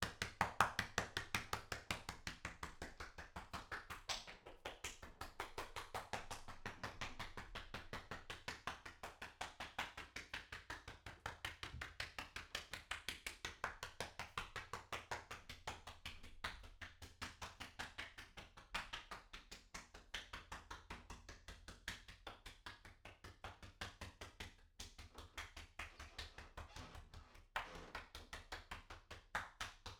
Sound effects > Human sounds and actions
Applause walking around room 4
Applaud, Applause, AV2, clapping, Rode, solo, XY